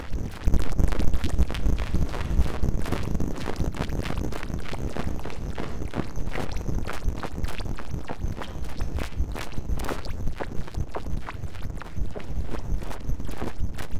Sound effects > Electronic / Design
Felching Fish 2
These samples were made by loading up samples from my FilthBot 125, Wood Chopping Techno and Broken Freezer packs into Soundmorph Evil Twin Reaktor ensemble. Expect muffy sci-noises and noisy 4/4 rhythms.
commons, creative, free, industrial-noise, noise, royalty, sci-fi, scifi, sound-design